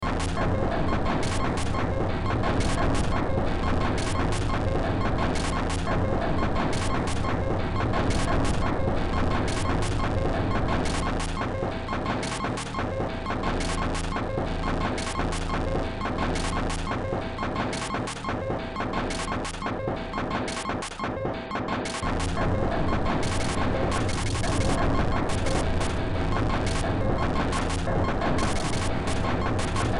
Music > Multiple instruments
Demo Track #3768 (Industraumatic)
Industrial, Sci-fi, Cyberpunk, Games, Noise, Soundtrack, Ambient, Horror, Underground